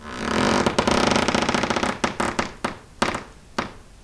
Sound effects > Objects / House appliances
Creaking Floorboards 13 Long Slow
Floorboards creaking, hopefully for use in games, videos, pack of 18.